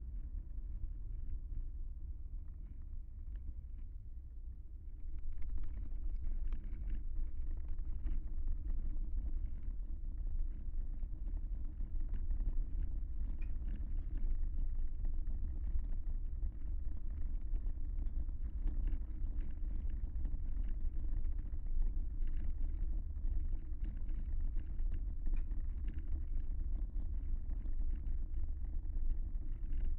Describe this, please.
Sound effects > Vehicles
Jeep-4xe-GravelRoad-ContactMic-F2025
Contact microphone attached to the dashboard of a jeep hybrid; driving slowly along a gravel road. There is a deep rumble.
jeep, gravel, drive, rumble